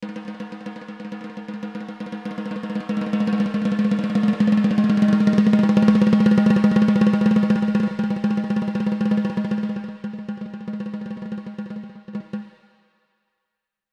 Music > Solo percussion
snare Processed - off dull roll, kinda latin - 14 by 6.5 inch Brass Ludwig
snaredrum, brass, hits, acoustic, percussion, crack, reverb, kit, realdrums, fx, snare, rim, snareroll, beat, sfx, rimshot, perc, drum, drumkit, oneshot, realdrum, drums, ludwig, snares, hit, flam, roll, processed, rimshots